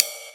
Instrument samples > Percussion
ride bell 2 brief
alloy, attack, bell, bronze, drums, hit, Meinl, metal, metronome, Paiste, percussion, ping, ride, Sabian, Wuhan, Zildjian